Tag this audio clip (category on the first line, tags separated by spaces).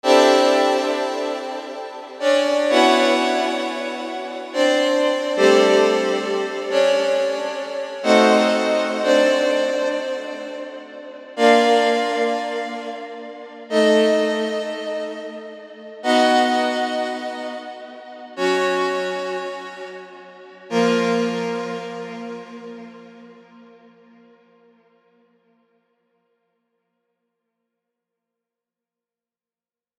Music > Multiple instruments
bad music synth